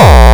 Instrument samples > Percussion
Retouched multiple kicks in FLstudio original sample pack. Processed with ZL EQ, Waveshaper.